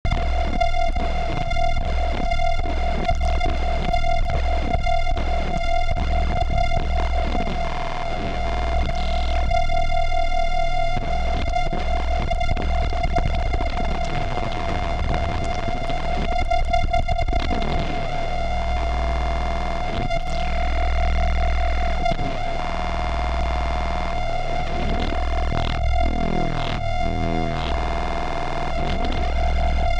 Sound effects > Experimental
Hemostasis Ritual Abort
Synth, FX, Bass, Futuristic, alien-sound-effects, Phased